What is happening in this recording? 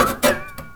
Sound effects > Other mechanisms, engines, machines
Handsaw Pitched Tone Twang Metal Foley 10
metallic, vibe, household, sfx, metal, twangy, handsaw, fx, shop, plank, smack, foley, twang, tool, vibration, hit, perc, percussion, saw